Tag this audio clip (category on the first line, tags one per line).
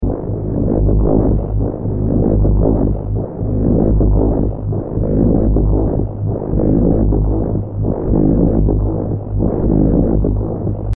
Sound effects > Electronic / Design
bass
human